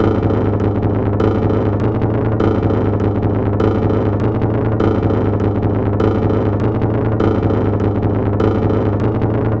Instrument samples > Percussion
This 200bpm Drum Loop is good for composing Industrial/Electronic/Ambient songs or using as soundtrack to a sci-fi/suspense/horror indie game or short film.
Industrial, Soundtrack, Weird, Ambient, Packs, Drum, Loop, Alien, Samples, Loopable, Dark, Underground